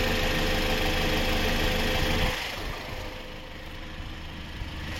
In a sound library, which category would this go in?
Sound effects > Other mechanisms, engines, machines